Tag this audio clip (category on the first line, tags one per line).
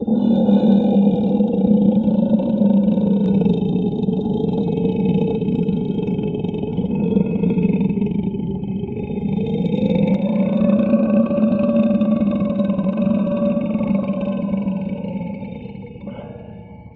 Sound effects > Experimental
monster
creature
growl
zombie